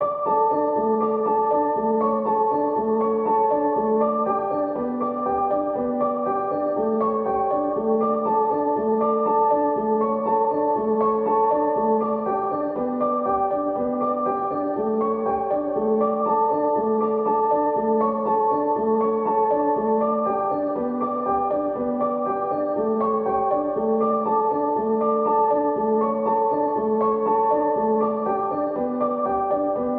Music > Solo instrument
Piano loops 198 efect 4 octave long loop 120 bpm
samples, simplesamples, pianomusic, piano, music, loop, 120, free, reverb, simple, 120bpm